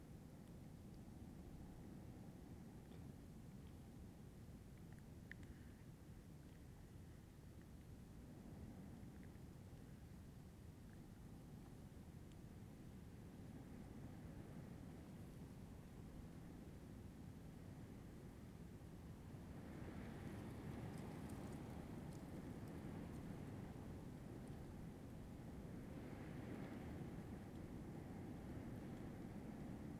Soundscapes > Nature
Wind in the Mexican Desert. Recorded on a Zoom H2N